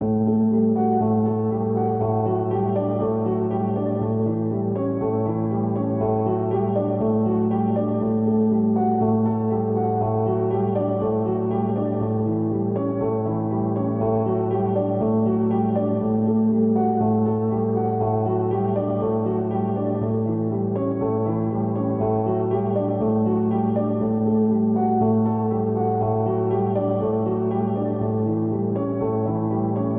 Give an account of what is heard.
Music > Solo instrument
Piano loops 066 efect 4 octave long loop 120 bpm
piano, 120, free